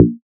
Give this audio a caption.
Instrument samples > Synths / Electronic
FATPLUCK 4 Bb
fm-synthesis, additive-synthesis, bass